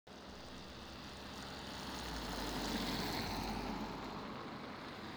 Sound effects > Vehicles
tampere car16
automobile, car, vehicle